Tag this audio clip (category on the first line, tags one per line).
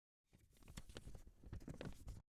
Sound effects > Objects / House appliances
origami
unfold